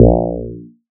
Instrument samples > Synths / Electronic
DUCKPLUCK 1 Bb
bass, additive-synthesis, fm-synthesis